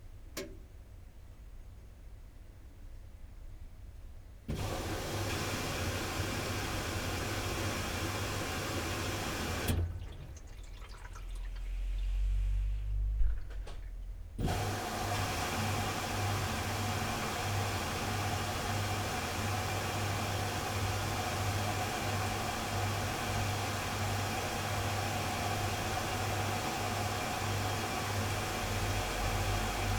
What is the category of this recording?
Sound effects > Objects / House appliances